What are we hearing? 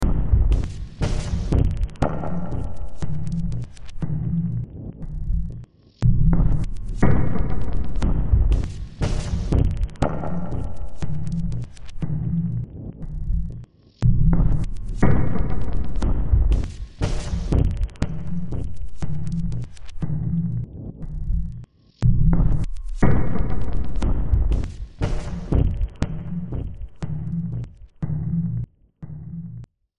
Music > Multiple instruments
Demo Track #3635 (Industraumatic)
Games, Ambient, Sci-fi, Soundtrack, Cyberpunk, Horror, Noise, Underground, Industrial